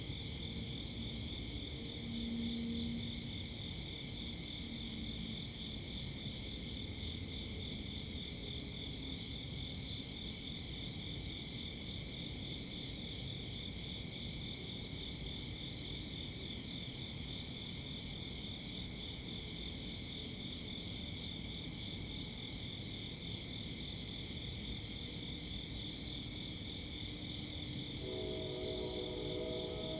Soundscapes > Urban
NIGHT IN OLD SUBURBIA MS
What it sounds like from my front walk at 3am. Recorded with a Zoom H2N. There is an XY complement
horn, night, train, suburbia, crickets, Train-horn